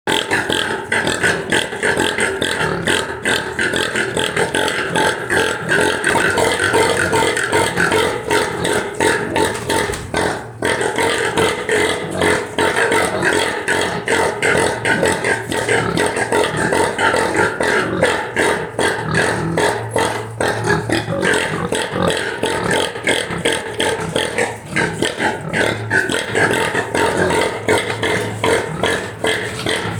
Sound effects > Animals
Swine - Pig; Various Pig Grunts, Close Perspective, Take 2
An LG Stylus 2022 was used to record these pigs.
livestock, boar, swine, oink, grunt, barnyard, snort, pig, barn, farm